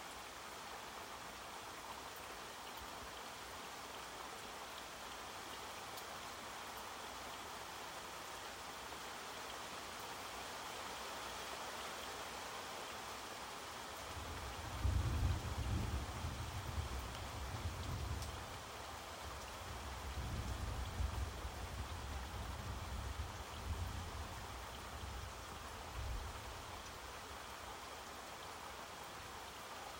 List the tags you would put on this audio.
Soundscapes > Nature
afternoon backwoods downpour field-recording forest jungle log-cabin nature rain rainstorm Scandinavia spring summer Sweden thunder torrent wilderness woodlands

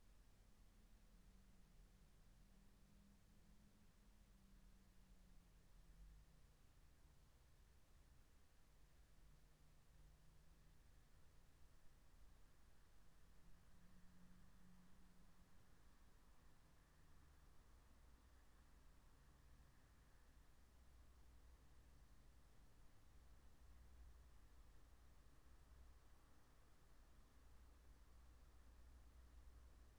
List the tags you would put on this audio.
Soundscapes > Nature
phenological-recording nature field-recording meadow raspberry-pi soundscape natural-soundscape alice-holt-forest

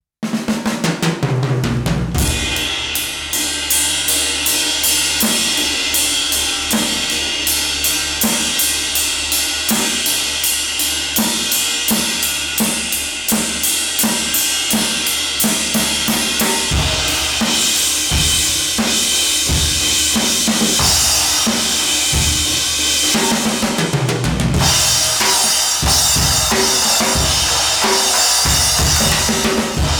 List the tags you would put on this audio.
Music > Solo percussion
acoustic beat breakcore drum drum-loop drummer drumming drums experimental garbage groovy high hq improvised indie jazz kick kit loop metal percs percussion percussion-loop playing punk quality rock sample track

